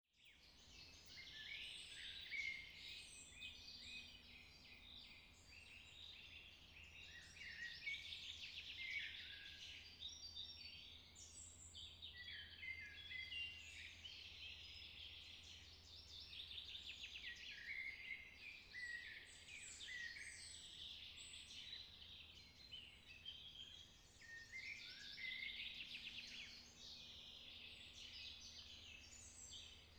Soundscapes > Nature
AMB FOREST, DISTANT TRAFFIC, EARLY MORNING [stereo]
Early morning recording on the 2nd of June, in the forest near Ivancea.
birds, birdsong, field-recording, forest, moldova, nature, summer